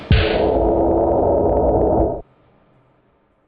Sound effects > Electronic / Design
Impact Percs with Bass and fx-035
combination, deep, theatrical, explosion, percussion, crunch, hit, perc, mulit, fx, impact, oneshot, explode, looming, ominous, foreboding, low, cinamatic, sfx, brooding, bash, bass, smash